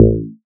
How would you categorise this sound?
Instrument samples > Synths / Electronic